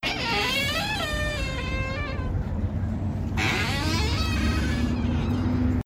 Sound effects > Objects / House appliances
Two, long door creaks. Recorded at Mike's Pizza and Grill.